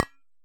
Sound effects > Objects / House appliances
Solid coffee thermos-004
percusive, recording, sampling